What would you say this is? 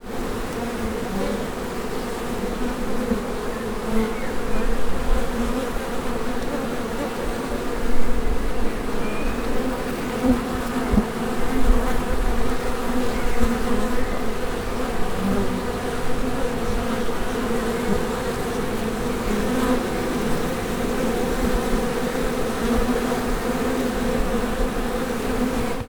Soundscapes > Nature
Very close to the beehive... Only got one stitch :-) "sum, sum, sum" Some birds in the background.